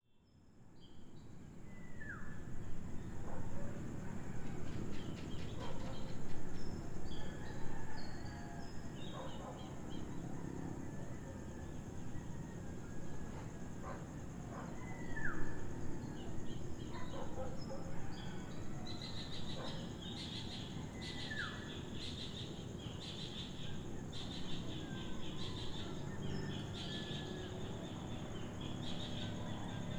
Soundscapes > Urban
250731 050936 PH Dawn chorus and sunrise in Filipino suburb
Dawn chorus and sunrise in a Filipino suburb. I made this recording at about 5:15AM, from the terrace of a house located at Santa Monica Heights, which is a costal residential area near Calapan city (oriental Mindoro, Philippines). One can hear the atmosphere of this place during sunrise, with some crickets, dawn chorus from local birds, roosters and dogs barking in the distance, some human voices and activities, a vehicle passing by (at #3:18), as well as some distant fishermen’s motorboats and traffic hum. At about #11:25, the cicadas slowly start to make some noise, and the bell from the nearby church starts ringing at #16:53. Recorded in July 2025 with a Zoom H6essential (built-in XY microphones). Fade in/out applied in Audacity.
ambience, atmosphere, barking, bell, birds, Calapan-city, calm, chirping, chorus, church, church-bell, cicadas, crickets, dawn, dawn-chorus, dogs, field-recording, general-noise, morning, motorboat, motorboats, Philippines, residential, soundscape, suburban, sunrise, vehicles, voices